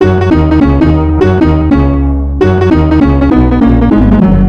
Music > Multiple instruments
An happy track i made in the SNES preset in furnace maker. 150bpm.